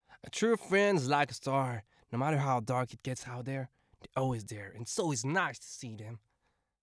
Speech > Solo speech
Surfer dude - A true friend is like a star 2
Subject : Recording my friend going by OMAT in his van, for a Surfer like voice pack. Date YMD : 2025 August 06 Location : At Vue de tout albi in a van, Albi 81000 Tarn Occitanie France. Shure SM57 with a A2WS windshield. Weather : Sunny and hot, a little windy. Processing : Trimmed, some gain adjustment, tried not to mess too much with it recording to recording. Done inn Audacity. Some fade in/out if a oneshot. Notes : Tips : Script : A true friend is like a star, no matter how dark it gets out there, they're always there and always nice to see them.
France, August, VA, Adult, 20s, Male, Surfer, A2WS, RAW, FR-AV2, SM57, mid-20s, In-vehicle, 2025, Mono, English-language, Dude, Single-mic-mono, Tascam, philosophical-bro, Cardioid, Voice-acting